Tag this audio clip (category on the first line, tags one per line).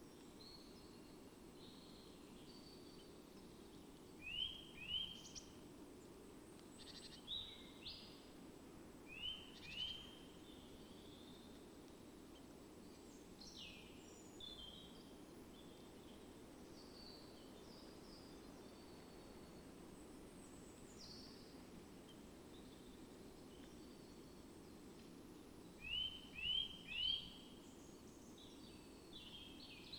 Soundscapes > Nature
alice-holt-forest
field-recording
phenological-recording
raspberry-pi
sound-installation